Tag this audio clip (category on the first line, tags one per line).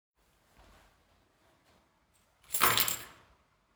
Sound effects > Objects / House appliances
indoors
room
rustling